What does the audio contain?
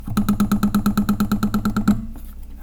Sound effects > Objects / House appliances
Metal Beam Knife Plank Vibration Wobble SFX 12
Beam, Clang, ding, Foley, FX, Klang, Metal, metallic, Perc, SFX, ting, Trippy, Vibrate, Vibration, Wobble